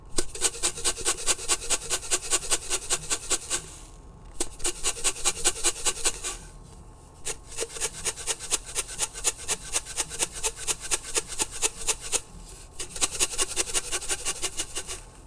Sound effects > Objects / House appliances
TOONShake-Blue Snowball Microphone, CU Salt Shaker Nicholas Judy TDC
Salt shaker shakes.
Blue-brand, shake, Blue-Snowball, foley, salt, shaker